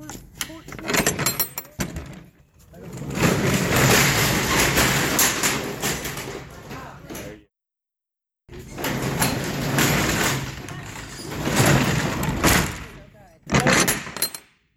Sound effects > Vehicles
A semi-truck trailer unlatching, sliding open and close with latch.